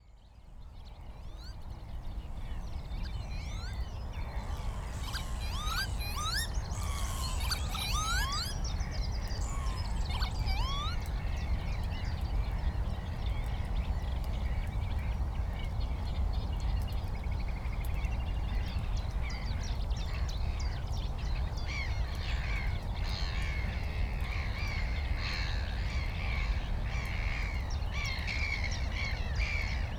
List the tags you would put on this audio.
Soundscapes > Nature
nature ambience recording field birds

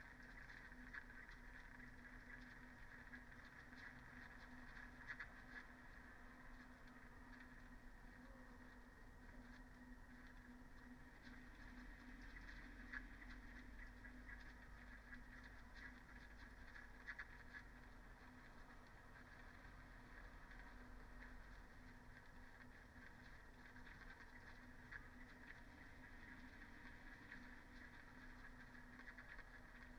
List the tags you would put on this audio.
Soundscapes > Nature
raspberry-pi artistic-intervention weather-data data-to-sound alice-holt-forest field-recording modified-soundscape phenological-recording nature soundscape Dendrophone natural-soundscape sound-installation

